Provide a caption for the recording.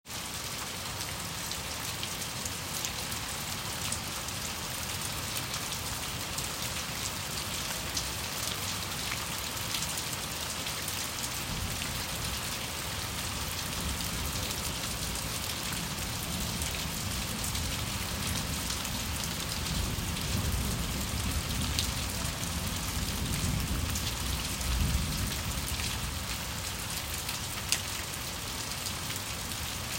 Sound effects > Natural elements and explosions
February rainstorm. Sound of rain and distant rolling thunder.